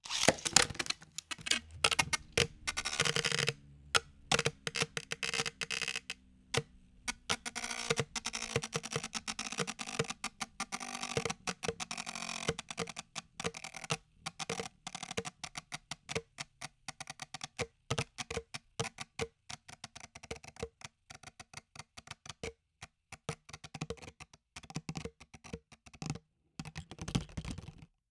Sound effects > Objects / House appliances
Beyblade Plastic-packaging
plastic, rubbing, Beyblade-X, SM57, Beyblade, Dare2025-08, metal, FR-AV2, Shure, Tascam, Dare2025-Friction